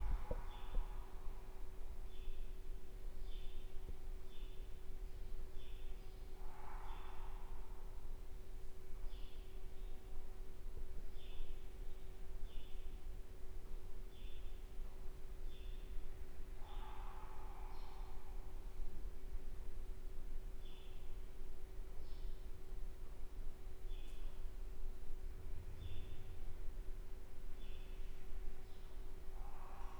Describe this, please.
Soundscapes > Indoors
Building entrance hall. Dog barking in the distance.
Recording of the entrance hall of my apartment building. you can hear the natural reverb of the place and a dog barking in the distance. Recorded with the integrated microphones on a Zoom H5, stereo settings.
h5, hall, reverb